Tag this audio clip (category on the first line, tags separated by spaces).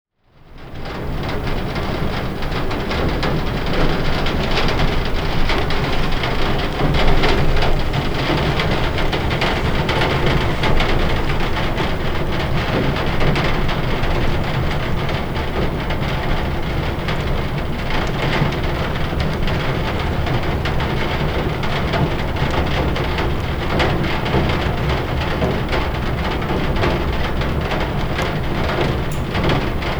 Soundscapes > Indoors
ambience inside rain room roomtone weather